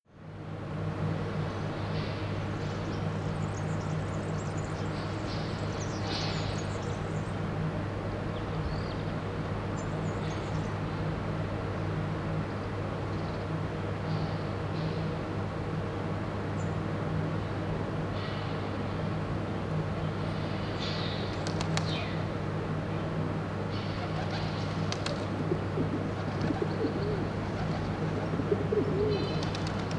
Soundscapes > Urban
041 BOTANICO POWER-PLANT BIRDS BUS
bus, birds